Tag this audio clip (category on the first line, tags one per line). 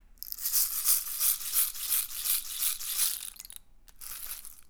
Sound effects > Objects / House appliances
bonk
clunk
drill
fieldrecording
foley
foundobject
fx
glass
hit
industrial
mechanical
metal
natural
object
oneshot
perc
percussion
sfx
stab